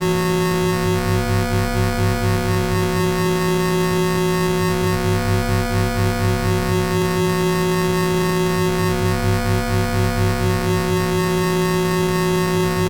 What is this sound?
Sound effects > Other mechanisms, engines, machines
IDM Atmosphare12 (C note )
Synthetic, Industry, IDM, Noise, Machinery, Working